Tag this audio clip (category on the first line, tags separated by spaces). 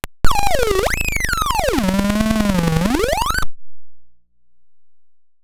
Sound effects > Electronic / Design
Theremin Handmadeelectronic Robot Trippy